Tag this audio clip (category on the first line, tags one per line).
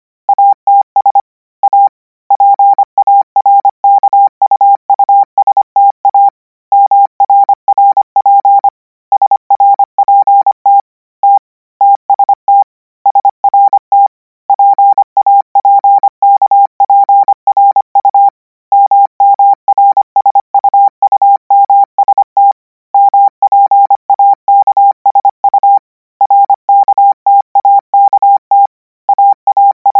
Sound effects > Electronic / Design
characters
code
codigo
morse
radio